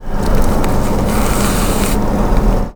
Sound effects > Human sounds and actions
Someone peeling a banana.